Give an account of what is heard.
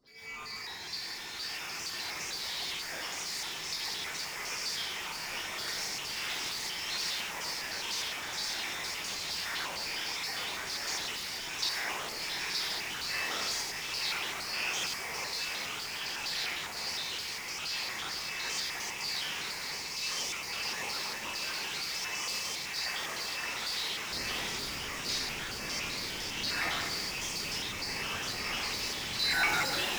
Sound effects > Electronic / Design
A sample of our blade sharpener is explored. This is an abstract noisy sample pack suitable for noise, experimental or ambient compositions.